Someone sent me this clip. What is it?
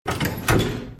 Sound effects > Other mechanisms, engines, machines
Industrial Lever
Using a phone, I recorded a household door handle, a low energy swinging door's push lever and its impact sound.
clunk, crank, industrial, lever, machine, pull, ratchet